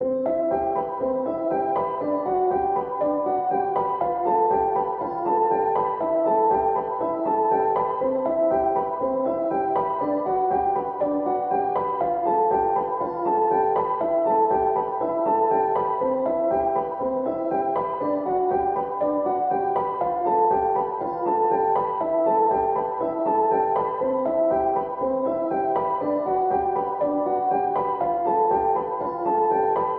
Music > Solo instrument
Piano loops 118 efect 4 octave long loop 120 bpm
120, 120bpm, free, loop, music, piano, pianomusic, reverb, samples, simple, simplesamples